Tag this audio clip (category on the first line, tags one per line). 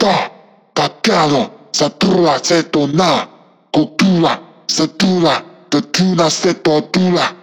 Music > Other
Acapella
Vocal
Brazil
BrazilFunk